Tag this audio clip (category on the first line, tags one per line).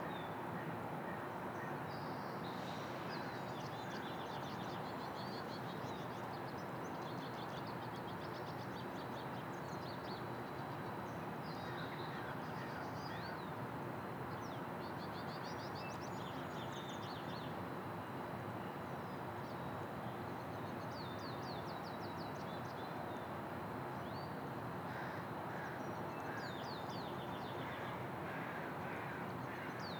Soundscapes > Urban
ambience
cars
city
field-recording
neighborhood
noise
people
soundscape
street
town
traffic
urban